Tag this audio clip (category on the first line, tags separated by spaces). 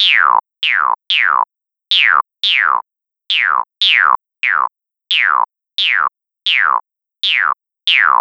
Sound effects > Animals
Baby-Crocodile Crocodile soundeffect